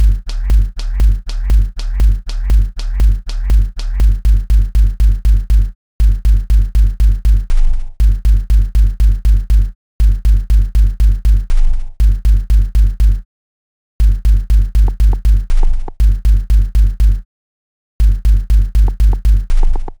Music > Other
I'm not sure of the best thing to call this. This is just one result of me tinkering in ableton for hours on end. The hihat is my usual hihat, made from a 606 hat combined with a gas stove sound and another digital sound, the kick is from a 707, and everything else is synthesized. It's all also been heavily distorted and cut many times over, to the point that I've sort of lost track and the part that sounds like a kick might not even have any part of the 707 in it. I played with loopers a bunch in the process of making this, so that could just be a nice bit of sine and noise wave. Use this in anything you like.